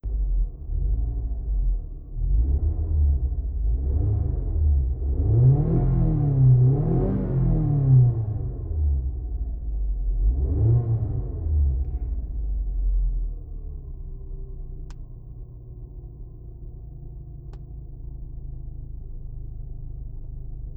Sound effects > Vehicles
Engine revving from inside the car; Continual revving up and down. Very bass heavy and muffled, with higher pitches at the start of each rev. Recorded on the Samsung Galaxy Z Flip 3. Minor noise reduction has been applied in Audacity. The car used is a 2006 Mazda 6A.